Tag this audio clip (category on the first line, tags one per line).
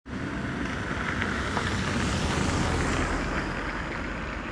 Sound effects > Vehicles
automobile
car
vehicle